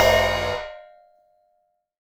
Music > Solo instrument
Zildjian 16 inch Crash-004
Oneshot, Crash, Zildjian, Metal, Perc, Drum, Cymbals, 16inch, Percussion, Custom, Drums, Kit, Cymbal